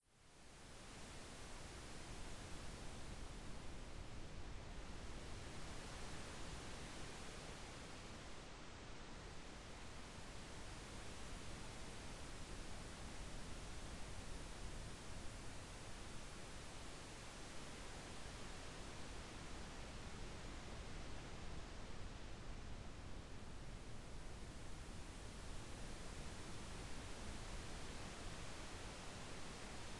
Sound effects > Natural elements and explosions
Trees in Wind, Soft Sea Currents, Pink Noise
Synthesized leaves in wind using different pink and brown noises fading in and out. Used Audacity.
white-noise, wind, flow, leaves, synthesizer, gentle, white, ambient, forest, brownian-noise, waves, simulated, pink-noise, asmr, sea, synthesized, ebb, brownian, fade, soft, tree, trees, pink, nature, brown-noise